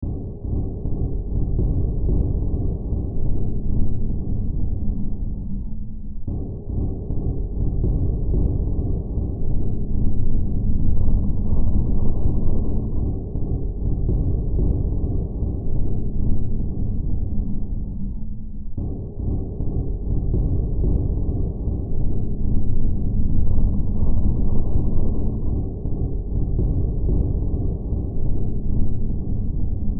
Soundscapes > Synthetic / Artificial
Looppelganger #145 | Dark Ambient Sound
Ambience, Ambient, Darkness, Drone, Games, Gothic, Hill, Horror, Noise, Sci-fi, Silent, Soundtrack, Survival, Underground, Weird